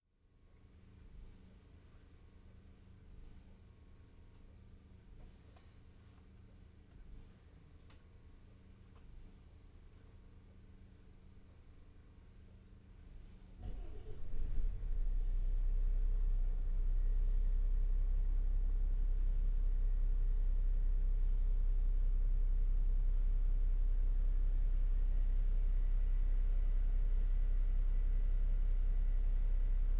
Soundscapes > Urban
As heard sitting on the number 9 bus to Southampton.
bus, Southampton, UK, transportation, transport, engine, vehicle